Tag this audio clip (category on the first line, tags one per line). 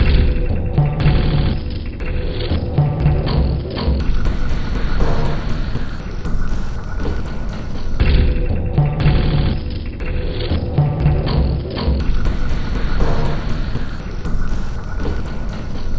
Instrument samples > Percussion
Samples
Weird
Loop
Alien
Ambient
Underground
Dark
Packs
Loopable
Drum
Soundtrack
Industrial